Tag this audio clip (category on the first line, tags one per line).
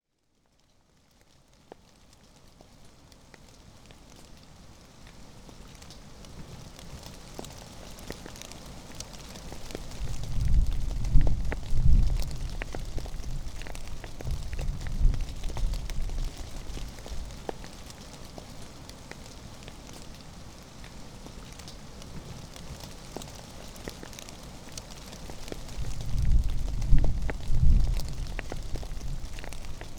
Soundscapes > Nature
Storm
Lightning
Thunderstorm
Weather
Rain
Thunder